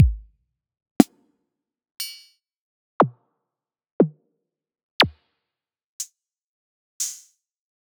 Instrument samples > Percussion
Lucia Drum Kit #008
Self contained drum kit made of 8 samples equally spaced. It has kick, snare, woodblock, rimshot, thwack, cowbell, closed hihat and open hihat. It was created with the Ruismaker app. To use them, you can either chop them or, as I do, use a grid/split function and select one of the 8 slices to play.
cowbell, drum, hihat, kick, kit, percussion, rimshot, snare, synth, thwack, tom, woodblock